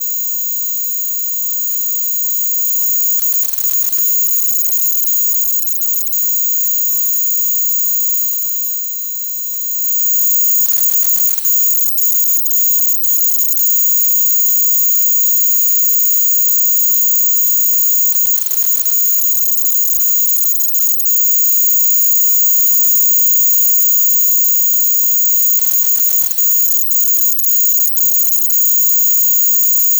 Sound effects > Objects / House appliances
Electromagnetic Field Recording of Thermostat
Electromagnetic field recording of a house thermostat. Electromagnetic Field Capture: Electrovision Telephone Pickup Coil AR71814 Audio Recorder: Zoom H1essential
coil, electric, electrical, electromagnetic, field, field-recording, magnetic, thermostat